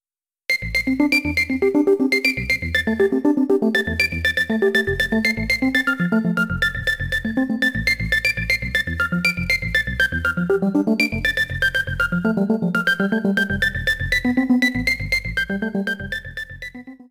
Music > Solo instrument
little jazz bird - 120 bpm
The cheerful chirp riffing of an electronic jazz bird with piping synth sounds. 120 bpm, 4/4, E flat minor. Could represent a bird character in a game or animation. Made with Ableton Live and my MIDI keyboard and polished with Audacity.
synth, 120bpm, loop